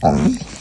Sound effects > Animals
Dog Groan
Malinois Belgian Shepherd's good morning groan. iPhone 15 Pro video recording extracted via Audacity 3.7.5.
groan, groaning, moan, pupper, puppy, voice